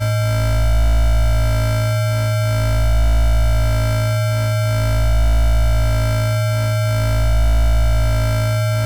Sound effects > Other mechanisms, engines, machines
IDM Atmosphare6( A note )
Synthetic, Working, IDM, Noise, Machinery, Industry